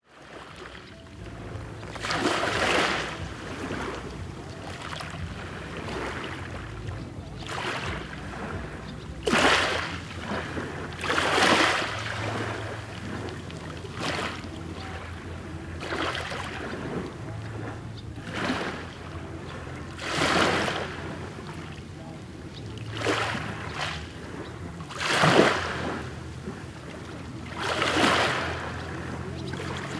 Soundscapes > Nature
Corfu - Boukari Beach - Waves Lapping Pebbles
Field-recording made in Corfu on an iPhone SE in the summer of 2025.
pebbles, water, beach, ocean, lapping, waves, fieldrecording, shore, sea, corfu